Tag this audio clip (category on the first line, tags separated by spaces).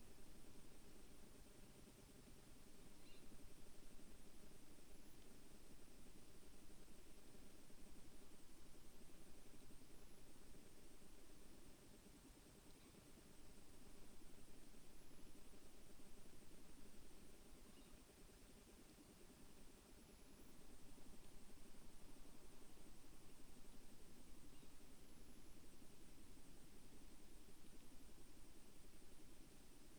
Soundscapes > Nature
Dendrophone soundscape weather-data field-recording nature sound-installation phenological-recording natural-soundscape raspberry-pi data-to-sound artistic-intervention alice-holt-forest modified-soundscape